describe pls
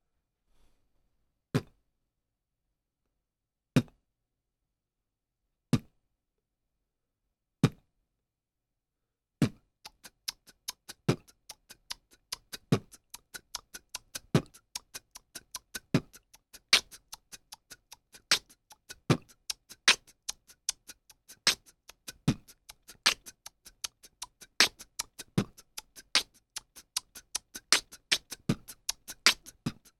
Music > Solo percussion
Beatbox Freestyle Session 1
Unprocessed, unedited beatbox freestyle session
human-beatbox, mouth